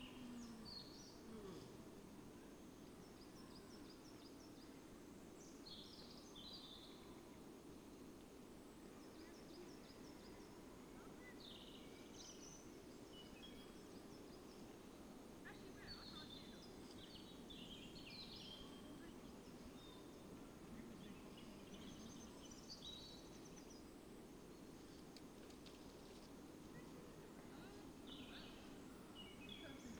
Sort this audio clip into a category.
Soundscapes > Nature